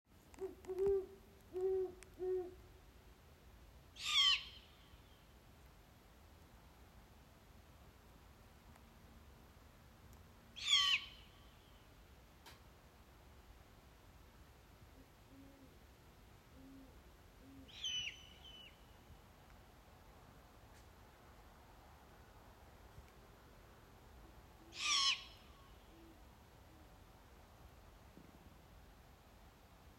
Soundscapes > Nature
Barn owls and Great Horn Owl in the night 09/22/2024
Owls in the night
birds, owls